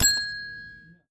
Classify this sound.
Sound effects > Objects / House appliances